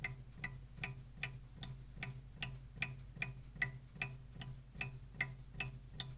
Sound effects > Objects / House appliances
Continuos rhythmic water drop
This was a water drop in my sink, it made a curious rhythmic sound so I recorded it.